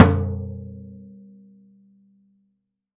Music > Solo instrument
Low Floor Tom Sonor Force 3007-004
Ride, Drum, GONG, Oneshot, Percussion, Cymbal, Drums, Paiste, Hat, Cymbals, Metal, Crash